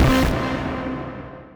Instrument samples > Synths / Electronic
CVLT BASS 85
bass, bassdrop, clear, drops, lfo, low, lowend, stabs, sub, subbass, subs, subwoofer, synth, synthbass, wavetable, wobble